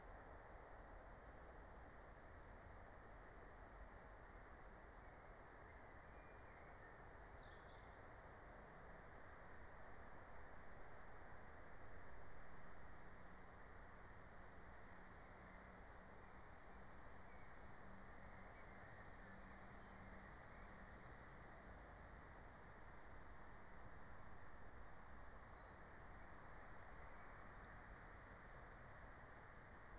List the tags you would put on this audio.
Soundscapes > Nature
field-recording
raspberry-pi
soundscape

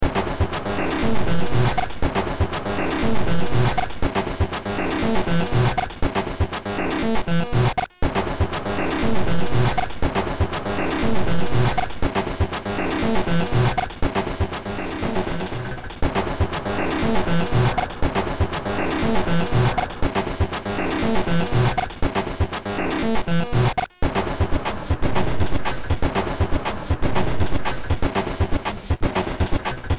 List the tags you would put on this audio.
Music > Multiple instruments
Ambient
Cyberpunk
Games
Industrial
Soundtrack
Underground

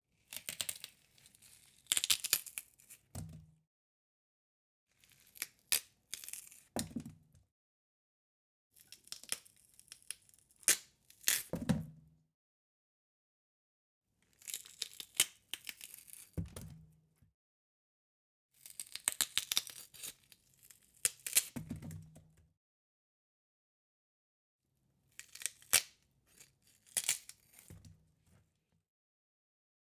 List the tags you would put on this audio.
Sound effects > Objects / House appliances
berry
bones
breaking
cooking
cracking
crunch
crunching
cutting
food
fracture
fruit
kitchen
knife
punch
vegetable
watermelon

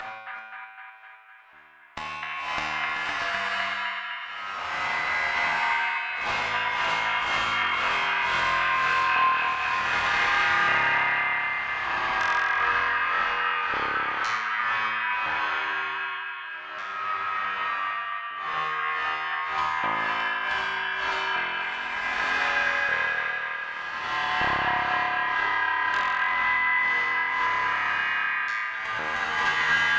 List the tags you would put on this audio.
Soundscapes > Synthetic / Artificial
alien
ambience
bass
bassy
evolving
glitchy
rumble
sfx
synthetic
texture
wind

